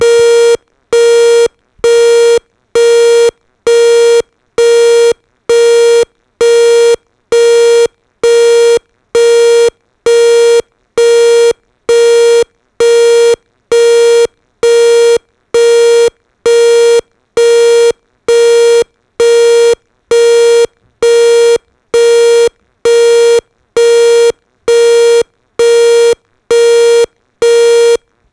Sound effects > Objects / House appliances
442hz tone Lo-Fi from speaker
Intending to re-do a digital alarm clock sound, I generated a 884 hz square wave on Audacity and sampled it though my Pocket Operator PO-33 to crunchy lo-fi it. Played at half speed. I then recorded it from the loudspeaker using a zoom h2n's Mono mic mode. Made and recorded on the 2025 05 12. Using a PO-33 and Zoom H2n. Trimmed and Normalized in Audacity.
884hz, A, A-note, phone, Po-33, square-wave, Tone, unpure, voice-message-tone